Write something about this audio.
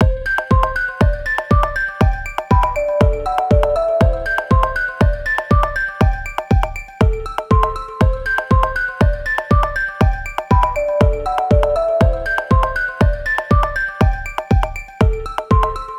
Music > Multiple instruments
Piano Drum Loop Disco Organic Melodic #001 at 120 bpm
A piano loop with drums in the background. It sounds to me a mix between disco, organic and melodic. The melody is from Piano Motifs from iPad.
melodic, drums, 120-bpm, piano, organic, loop, disco, beat, percussion-loop, drum, drum-loop, rhythm, percussion, groovy, 120bpm